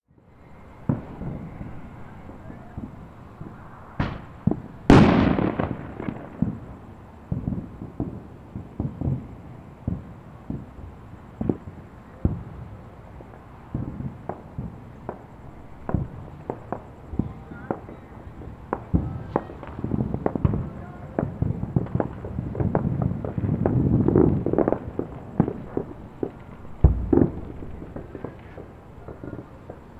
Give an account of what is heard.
Sound effects > Natural elements and explosions
4th July Fireworks
Recording during 4th of July celebrations, sounded kinda like the background of a warzone and came out really cool. Recorded using a Tascam DR-40X
atmosphere, background, background-sound, bombs, fireworks, soundscape, war